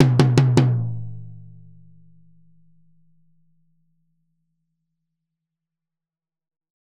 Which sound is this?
Music > Solo percussion
Med-low Tom - Oneshot 63 12 inch Sonor Force 3007 Maple Rack
wood, med-tom, kit, real, Medium-Tom, drum, oneshot, perc, percussion, realdrum, tomdrum